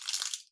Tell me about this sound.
Instrument samples > Percussion
Cellotape Percussion One Shot6
Cellotape Percussion One-Shots A collection of crisp, sticky, and satisfyingly snappy percussion one-shots crafted entirely from the sound of cellotape. Perfect for adding organic texture, foley-inspired rhythm, or experimental character to your beats. Ideal for lo-fi, ambient, glitch, IDM, and beyond. Whether you're layering drums or building a track from scratch, these adhesive sounds stick the landing.